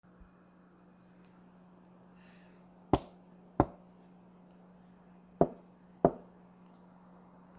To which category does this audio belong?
Sound effects > Objects / House appliances